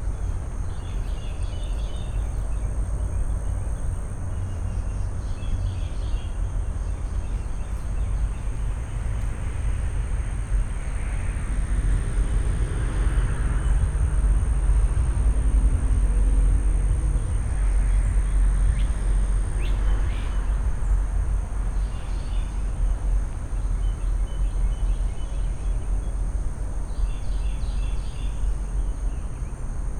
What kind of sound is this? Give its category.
Soundscapes > Urban